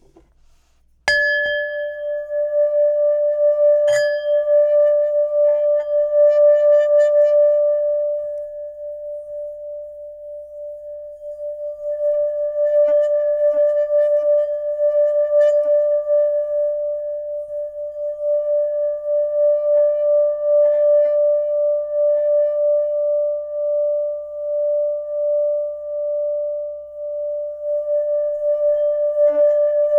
Instrument samples > Other
Singing Bowl 600hz
This is a recorded sample of a metal singing bowl being struck once and resonated with a felt stick. Based on the spectrogram of the sound, 600Hz came in the strongest, hence the name of the sound. This was recorded with an MXL 770 microphone approximately 10 inches away from the resonating bowl
brass, singing-bowl